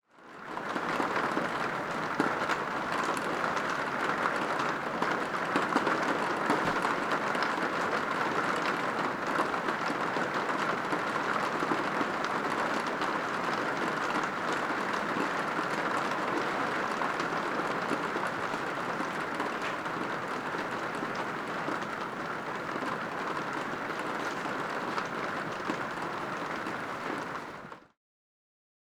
Sound effects > Natural elements and explosions
Light rain falling onto a metal roof.
rain, raindrops, raining, roof, sfx, shower, weather